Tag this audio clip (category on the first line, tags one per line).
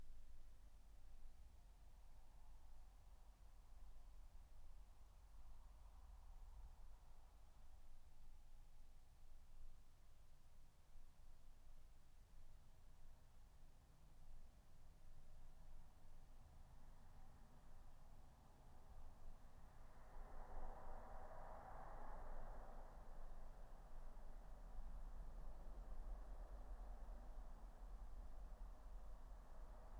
Soundscapes > Nature
soundscape
alice-holt-forest
raspberry-pi
field-recording